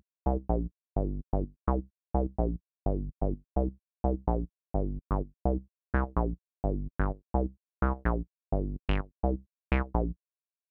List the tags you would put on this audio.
Music > Solo instrument
TB-03 hardware Acid techno Roland synth electronic Recording 303 house

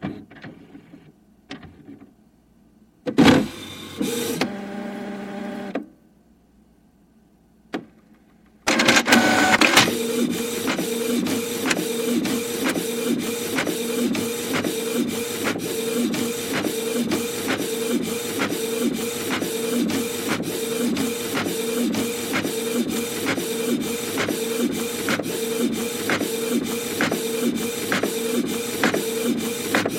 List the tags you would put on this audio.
Sound effects > Other
Canon canon-pixma ink machine printers sleep

